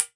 Soundscapes > Other
An IR Impulse Response of the inside of a oven. Speaker on the grill mid height. Mic on the ceiling. Made by experimenting with a overly complicated "test tone" of sine-sweeps, and bursts of noise/tones. I used a 10€ speaker and a Dji mic 3. Testing that small setup by placing it in a fridge, oven and washing machine. Deconvoluted and then trimmed/faded out in audacity. You can use an IR with a convolution plugin/vst to replicate tones or reverbs/delays. 2025 12 24 Albi France.